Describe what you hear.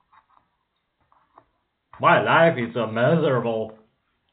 Speech > Solo speech
talking umbrella - 2
Another voice recorded by an umbrella